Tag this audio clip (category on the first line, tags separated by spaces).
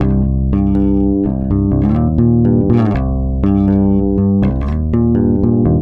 Instrument samples > String
fx oneshots loops rock plucked bass riffs slide funk blues mellow charvel loop electric pluck